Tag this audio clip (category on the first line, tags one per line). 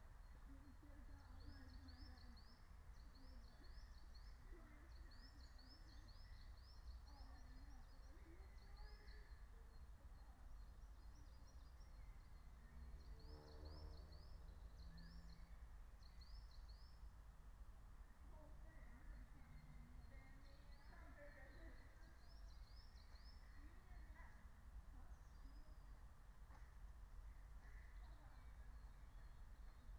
Soundscapes > Nature
field-recording
nature
meadow
raspberry-pi
natural-soundscape
phenological-recording
alice-holt-forest
soundscape